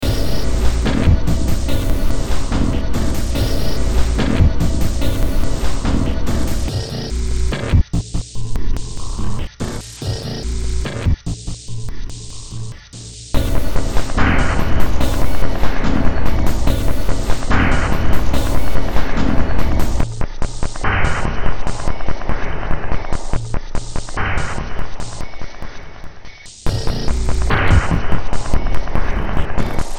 Music > Multiple instruments
Short Track #3375 (Industraumatic)
Games, Horror, Soundtrack, Industrial, Cyberpunk, Underground, Sci-fi